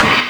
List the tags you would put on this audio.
Instrument samples > Percussion
Avedis
bang
China
clang
clash
crack
crash
crunch
cymbal
Istanbul
low-pitched
Meinl
metal
metallic
multi-China
multicrash
Paiste
polycrash
Sabian
shimmer
sinocrash
sinocymbal
smash
Soultone
spock
Stagg
Zildjian
Zultan

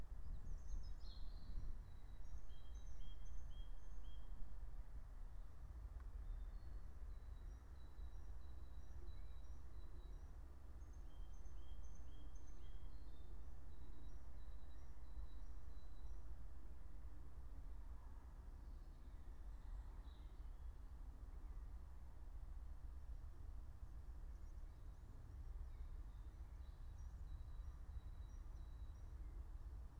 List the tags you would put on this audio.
Soundscapes > Nature
alice-holt-forest
meadow
natural-soundscape
nature
phenological-recording

